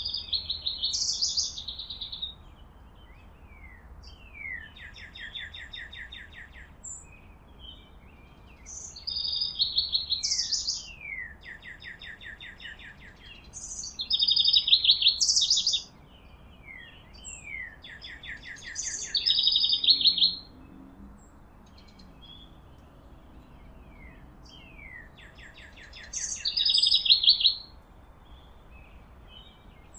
Sound effects > Animals
Morning Birds in my bacjyard in Virginia.
These are the sounds of my backyard. The sounds of the birds of Virginia in April.
bird, Birds, sounds, Virginia